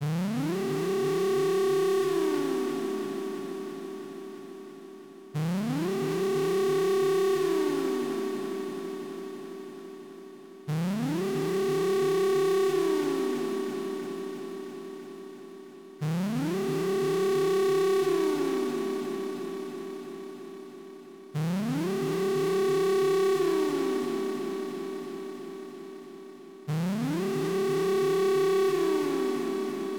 Sound effects > Other mechanisms, engines, machines
Alarm Siren
A siren sound i made for a song, should loop seamlessly Created using the Voltage Modular synthesizer